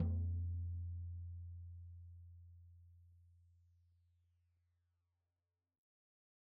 Music > Solo percussion
Floor Tom Oneshot -007 - 16 by 16 inch
fill, percs, instrument, rimshot, perc, beats, tomdrum, drums, floortom, toms, flam, drum, acoustic, beat, kit, velocity, roll, drumkit, studio, beatloop, oneshot, percussion, rim, tom